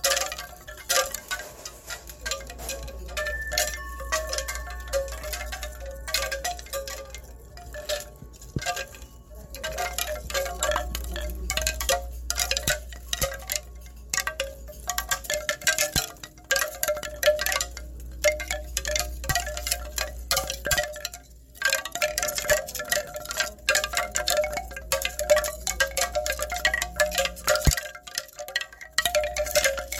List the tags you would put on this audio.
Music > Solo percussion
bamboo Phone-recording wind-chimes wooden

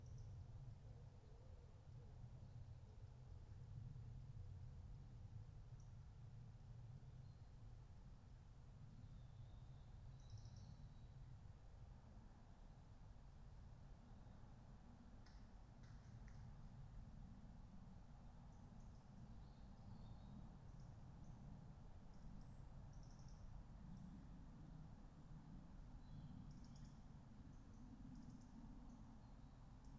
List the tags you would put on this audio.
Soundscapes > Nature
alice-holt-forest artistic-intervention data-to-sound field-recording natural-soundscape nature phenological-recording sound-installation

